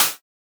Instrument samples > Synths / Electronic
Hat Open-01
An open hi-hat one-shot made in Surge XT, using FM synthesis.
electronic, fm, surge, synthetic